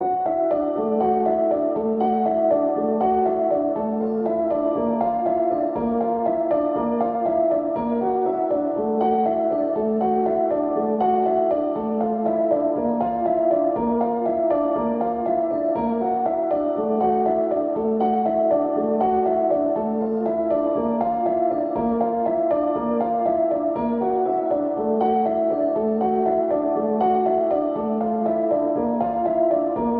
Music > Solo instrument
Piano loops 045 efect 4 octave long loop 120 bpm
120, 120bpm, free, loop, music, piano, pianomusic, reverb, samples, simple, simplesamples